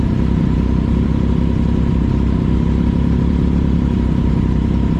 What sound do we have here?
Sound effects > Other mechanisms, engines, machines
clip prätkä (1)
Motorcycle, Supersport